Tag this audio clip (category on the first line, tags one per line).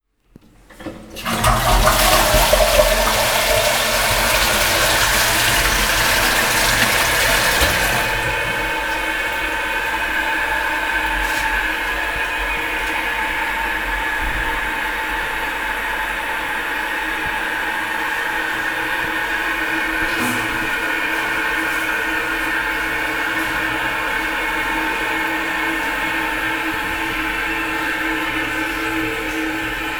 Sound effects > Objects / House appliances
Toilet
Flush
Vibrating